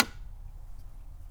Sound effects > Other mechanisms, engines, machines
Woodshop Foley-015
shop, bop, fx, bam, sound, rustle, little, bang, knock, boom, tools, foley, perc, strike, wood, tink, crackle, thud, oneshot, metal, percussion, pop, sfx